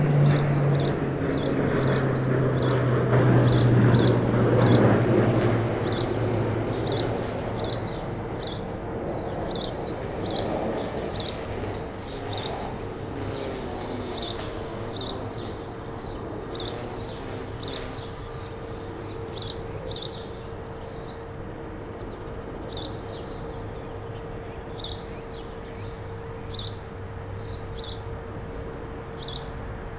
Soundscapes > Urban
AMBSubn-Spring Madisonville Neighborhood Midday yard work and traffic QCF Cincinnati ZoomH4n
Afternoon Ambiance in neighborhood with nearby yardwork
ambience, field-recording, neighborhood, spring, springtime, yardwork